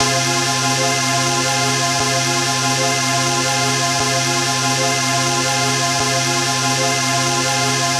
Music > Solo instrument
120 C CasioSK1Texture 01
80s, Analog, Analogue, Brute, Casio, Electronic, Loop, Melody, Polivoks, Soviet, Synth, Texture, Vintage